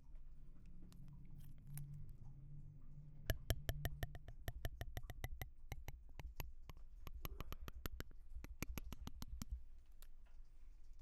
Soundscapes > Other
Water bottle filling up noise
I tried to make a water bottle sound using two plastic knives recorded using a condenser microphone
improvised, plasticknives, Waterbottle